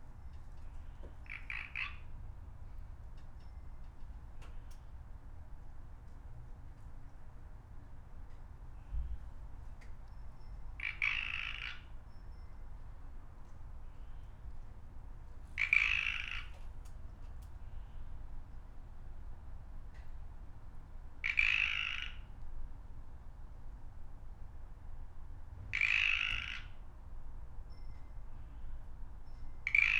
Soundscapes > Nature
Pacific Chorus Frog Call
The call of a Pacific Tree Frog aka Pacific Chorus Frog, in my backyard in Olympia, WA. Background sounds include a different frog, chimes, birds, and other neighborhood sounds. Recorded with Zoom F3 and SO.1 omni mics.